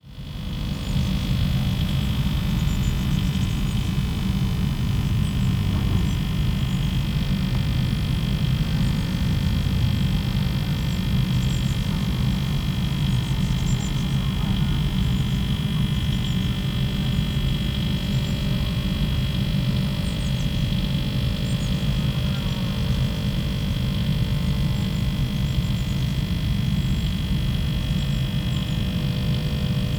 Soundscapes > Other
A recording of an air conditioning unit from outside, Close up.
air, conditioning, hum, machine, mechanical, noise, outdoor